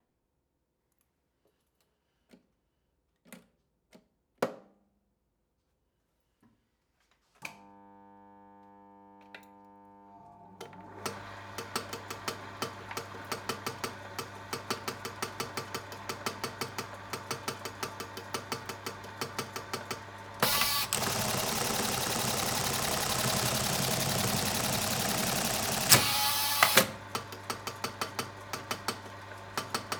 Sound effects > Other mechanisms, engines, machines
Eyelet machine Sewing Workshop
An eyelet machine ("occhiellatrice" in italian) start, idle, run, and stop. Sartoria Sacripante, Verona, Italia, december 2025 Recorded with Audix SCX-One-Hc & Tascam FR-AV2